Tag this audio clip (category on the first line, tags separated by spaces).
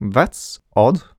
Speech > Solo speech
confused dialogue FR-AV2 Human Male Man Mid-20s Neumann NPC oneshot phrase singletake Single-take talk Tascam U67 Video-game Vocal voice Voice-acting words